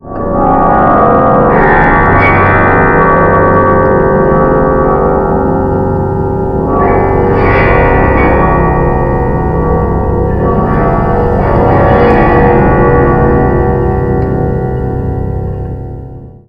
Soundscapes > Nature
Text-AeoBert-Pad-pings-16
aeolian, moody, rain, storm, pings, swells